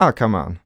Speech > Solo speech
Annoyed - Ah common
oneshot, Male, Voice-acting, annoyed